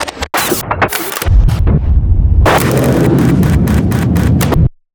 Sound effects > Other mechanisms, engines, machines
Sound Design Elements-Robot mechanism-011
Sound Design Elements-Robot mechanism SFX ,is perfect for cinematic uses,video games. Effects recorded from the field.
actuators, automation, circuitry, clanking, clicking, design, digital, elements, feedback, gears, grinding, hydraulics, machine, mechanical, mechanism, metallic, motors, movement, operation, powerenergy, processing, robot, robotic, servos, sound, synthetic, whirring